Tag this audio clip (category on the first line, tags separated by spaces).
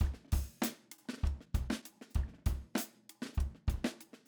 Music > Solo percussion
drums kit live loop recording studio